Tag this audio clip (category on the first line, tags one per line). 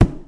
Sound effects > Objects / House appliances
bucket
carry
clang
clatter
cleaning
container
debris
drop
fill
foley
household
knock
lid
metal
object
plastic
scoop
shake
slam
spill
tip
tool